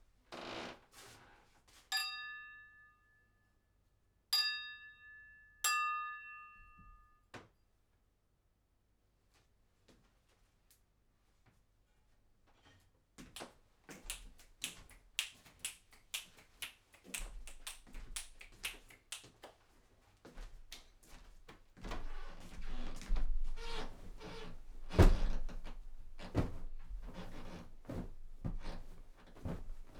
Sound effects > Experimental
People interaction noise - 2
A series of me recording multiple takes in a medium sized bedroom to fake a crowd. Clapping/talking and more atypical applause types and noises, at different positions in the room. Here interacting with different objects and stuff. Recorded with a Rode NT5 XY pair (next to the wall) and a Tascam FR-AV2. Kind of cringe by itself and unprocessed. But with multiple takes mixed it can fake a crowd. You will find most of the takes in the pack.
FR-AV2, indoor, Interaction-noise, noise, noises, NT5, objects, person, presence, Rode, room, solo-crowd, stuff, Tascam, XY